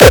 Instrument samples > Percussion
Frechcore Punch Testing 2-Short
Sample layered from Flstudio original sample pack: 909 kick, Minimal Kick 06, and a Grv kick. Plugin used: ZL EQ, Waveshaper.
Frechcore, Hardcore, Hardstyle, Kick, Punch